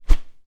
Sound effects > Objects / House appliances
Whoosh - Plastic Hanger 2 (Outer clip) 6
Subject : Whoosh from a plastic clothe hanger. With clips adjustable across the width of it. I recorded whooshes with the clip on the outer edge and near the center hanger. Date YMD : 2025 04 21 Location : Gergueil France. Hardware : Tascam FR-AV2, Rode NT5 pointing up and towards me. Weather : Processing : Trimmed and Normalized in Audacity. Probably some fade in/out.
Airy, coat-hanger, Fast, FR-AV2, Hanger, NT5, Plastic, Rode, SFX, swing, swinging, Tascam, Transition, Whoosh